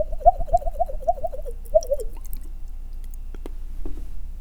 Sound effects > Objects / House appliances
knife and metal beam vibrations clicks dings and sfx-058
Perc, Beam, SFX, Wobble, Clang, Metal, FX, ting, Vibrate, Vibration, Foley, Klang, Trippy, ding, metallic